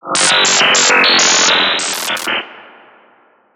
Sound effects > Electronic / Design
robotic chirp (cyberpunk ambience)
some synthwave/cyberpunk style sound design this morning
ambience, synth, synthwave, electronic, 80s, sfx, techno, retro, cyberpunk